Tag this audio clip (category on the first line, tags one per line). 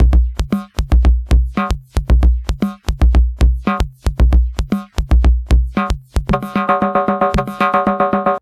Music > Solo percussion
music
Vintage
Electronic
Loop
Kit
Synth
DrumMachine
Modified
Drum
Analog
Bass
606
Mod